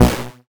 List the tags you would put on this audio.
Instrument samples > Synths / Electronic
additive-synthesis
bass
fm-synthesis

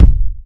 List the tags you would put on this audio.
Instrument samples > Percussion
bass-drum
pop
groovy
death-metal
bassdrum
forcekick
bass
mainkick
thrash-metal
percussive
drum
attack
beat
semi-electronic
thrash
fatdrum
kick
headwave
rhythm
fatkick
headsound
rock
percussion
trigger
fat-drum
metal
fat-kick
drums
artificial
hit

